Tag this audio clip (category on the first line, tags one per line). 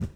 Sound effects > Objects / House appliances
pour
clatter
carry
kitchen
liquid
plastic
debris
garden
tip
fill
water
bucket
object
spill
clang
handle
lid
knock
foley
container
drop
slam
cleaning
pail
metal
household
hollow